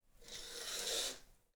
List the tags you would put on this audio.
Sound effects > Objects / House appliances
curtain Mono Opening window

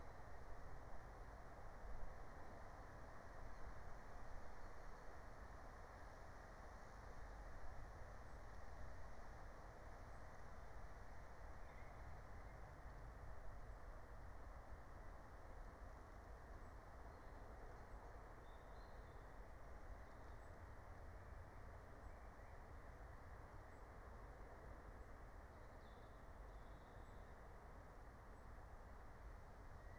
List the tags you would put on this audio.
Soundscapes > Nature
meadow raspberry-pi field-recording natural-soundscape phenological-recording alice-holt-forest nature soundscape

